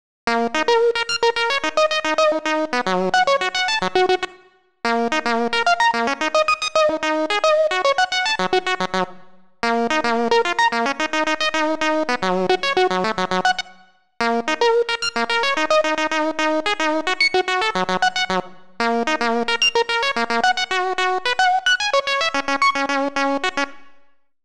Music > Solo instrument
Series of squawky synth notes that sound like a computer singing a boppy little tune to itself. Made with my MIDI controller, GarageBand, and BandLab. 120 bpm.